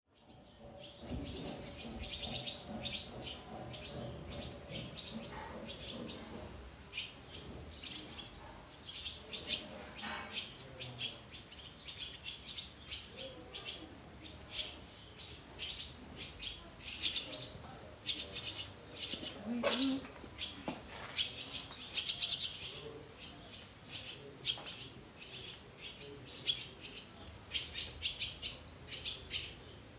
Soundscapes > Nature
Corfu - Swifts, Distant Noise
Field-recording made in Corfu on an iPhone SE in the summer of 2025.